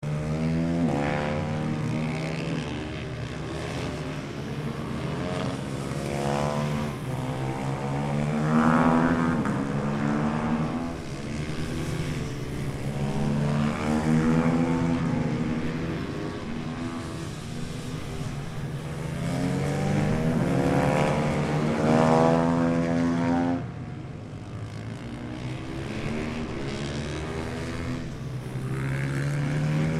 Soundscapes > Other
Supermoto Polish Championship - May 2025 - vol.2 - Racing Circuit "Slomczyn"
bikes, engine, moto, motorbikes, motorcycles, race, racetrack, racing, supermoto, warszawa